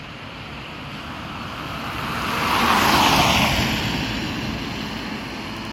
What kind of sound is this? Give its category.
Soundscapes > Urban